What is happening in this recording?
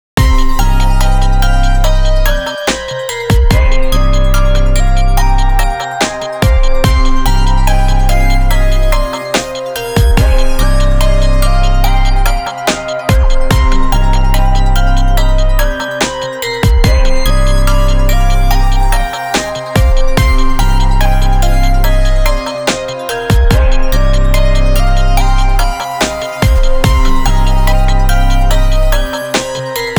Music > Multiple instruments
hip hop beat loop melody with bass
bass, beat, chill, dark, downtempo, hip, hiphop, hop, loop, melodic, melody, percussion